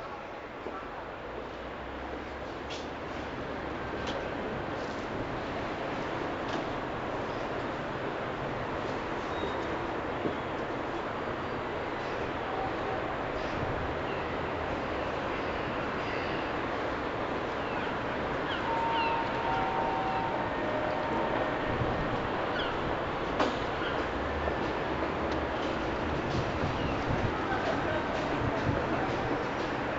Soundscapes > Urban
Old recording, made probably with phone, during my 2015 January work commute. Part 5: Footsteps on platform You can hear traffic from a street nearby, PA and some distinctive footsteps.